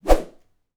Sound effects > Natural elements and explosions
Stick - Whoosh 2
NT5, one-shot, SFX, Woosh